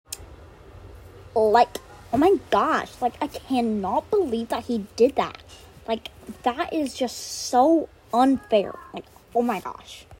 Speech > Solo speech
Average White women
White women blabbing
female, speak, english, White, talk, annoying